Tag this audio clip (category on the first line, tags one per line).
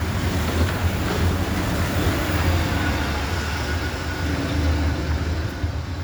Sound effects > Vehicles
vehicle
transportation